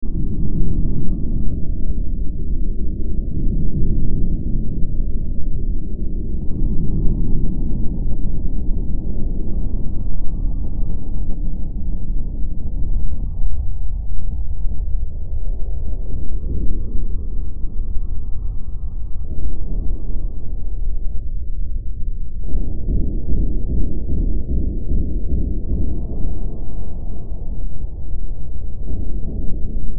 Soundscapes > Synthetic / Artificial
Looppelganger #171 | Dark Ambient Sound
Use this as background to some creepy or horror content.
Ambience, Darkness, Drone, Gothic, Hill, Horror, Noise, Sci-fi, Survival, Underground